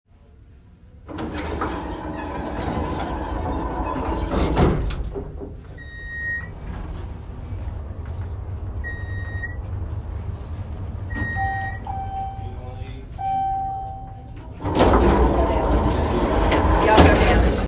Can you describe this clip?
Sound effects > Other mechanisms, engines, machines
Price Tower Elevator 1
Elevator doors closing and opening after ride up a few floors. Mechanical beep with each floor. General ambiance of people can be heard outside the elevator upon arrival. Recorded at Price Tower in Bartlesville, OK, architect Frank Lloyd Wright's only skyscraper ever constructed.
elevator, open